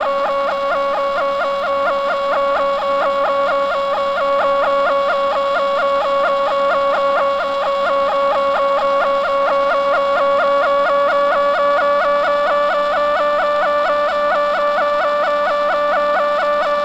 Sound effects > Objects / House appliances
Radio AM interference
A radio picking up on interference when switched to AM mode
am, electronic, interference, longwave, radio, static